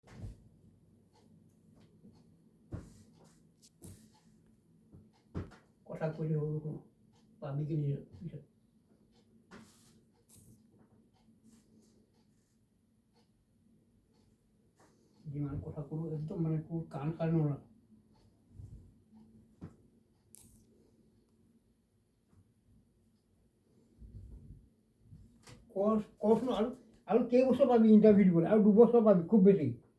Speech > Solo speech
Classical Fatherhood Orthodox
This is how a father scolds his son
Peace, Scary